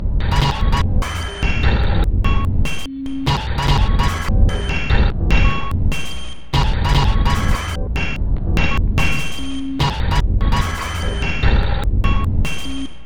Instrument samples > Percussion
This 147bpm Drum Loop is good for composing Industrial/Electronic/Ambient songs or using as soundtrack to a sci-fi/suspense/horror indie game or short film.
Industrial, Drum, Dark, Underground, Soundtrack, Loopable, Loop, Alien, Packs, Ambient, Samples, Weird